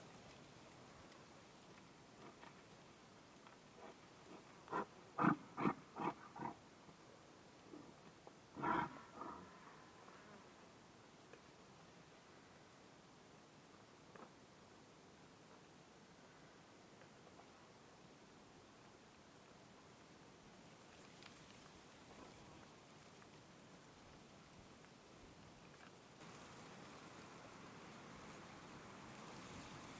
Soundscapes > Nature
Yak grunts
16/08/2025 - Alay Mountains, Kyrgyzstan Yaks grunting near Jiptik Pass in Kyrgyzstan. Recording fast forwards in the middle - silence too long Zoom H2N
mountains, yak, kyrgyzstan